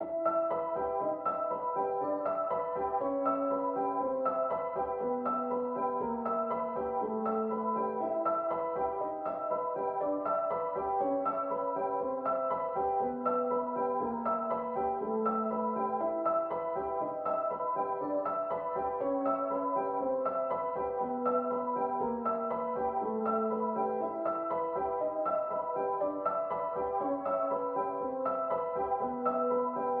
Music > Solo instrument
Piano loops 188 efect octave long loop 120 bpm
Beautiful piano harmony inspired by the work of Danny Elfman. This sound can be combined with other sounds in the pack. Otherwise, it is well usable up to 4/4 120 bpm.
120, 120bpm, free, loop, music, piano, pianomusic, reverb, samples, simple, simplesamples